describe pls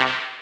Instrument samples > Synths / Electronic
CVLT BASS 134
bass, bassdrop, clear, drops, lfo, low, lowend, stabs, sub, subbass, subs, subwoofer, synth, synthbass, wavetable, wobble